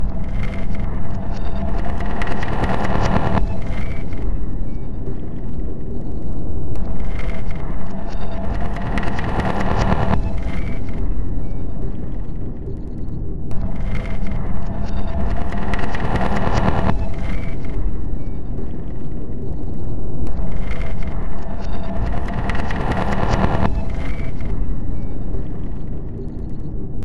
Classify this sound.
Instrument samples > Percussion